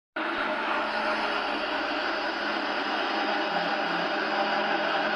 Sound effects > Vehicles
tram driving by5
Sound of a a tram drive by in Hervanta in December. Captured with the built-in microphone of the OnePlus Nord 4.
field-recording, track, traffic, tram